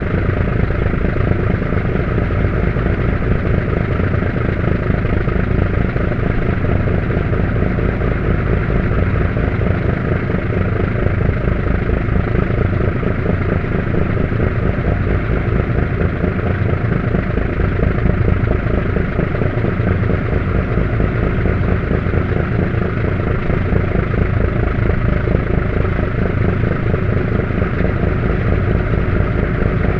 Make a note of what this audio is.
Sound effects > Other mechanisms, engines, machines
250827 105648 PH Ferryboat engine
Ferryboat engine at cruise speed. (Take 1) Recorded between Calapan city and Batangas city (Philippines), in August 2025, with a Zoom H5studio (built-in XY microphones). Fade in/out applied in Audacity.
motor, noise, mechanism, boat, machinery, ferry, motorboat, atmosphere, engine, seaman, seamen, ferryboat, field-recording, ambience, noisy, diesel, tanker, container-ship, machine, Philippines, ship, loud